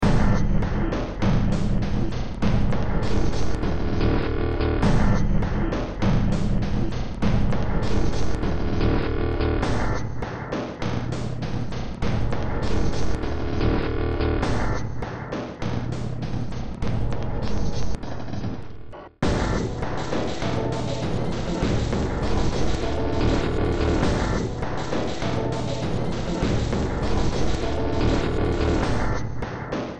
Music > Multiple instruments
Demo Track #3240 (Industraumatic)
Ambient, Cyberpunk, Games, Horror, Industrial, Noise, Sci-fi, Soundtrack, Underground